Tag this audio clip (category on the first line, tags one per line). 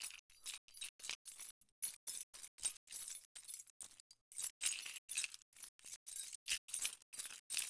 Sound effects > Objects / House appliances
jingle
keyring
metal-on-metal
shake